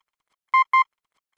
Sound effects > Electronic / Design
A series of beeps that denote the letter I in Morse code. Created using computerized beeps, a short and long one, in Adobe Audition for the purposes of free use.